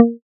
Instrument samples > Synths / Electronic
APLUCK 4 Bb
additive-synthesis, fm-synthesis, pluck